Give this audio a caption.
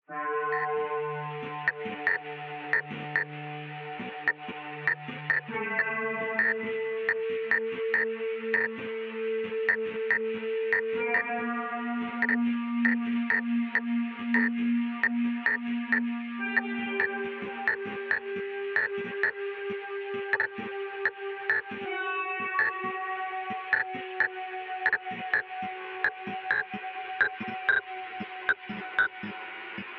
Music > Multiple instruments
Washed out tech glitching with horror synths
Stuttering hypnotic tech glitching sounds with synths over top. Made with circuit bending and Buchla synth.
background-music, circuit-bent, electro, experimental, glitch, haunting, horror, noise, pulp, soundtrack, synth, thriller